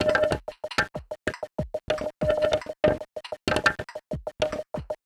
Music > Solo percussion
Drum Beat Wood Lofi 190bpm Loop
electronic, high-quality, lofi, percussion, percussive, urban, wood